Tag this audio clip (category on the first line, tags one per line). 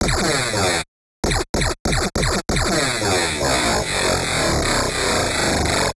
Sound effects > Electronic / Design
fire
gun
sci-fi
short
shot
synthetic
weapon